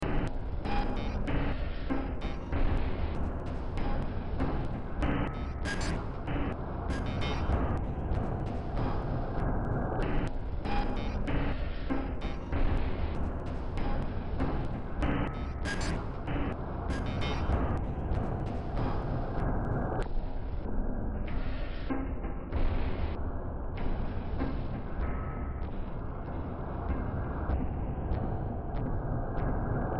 Music > Multiple instruments
Demo Track #4001 (Industraumatic)
Games, Industrial, Noise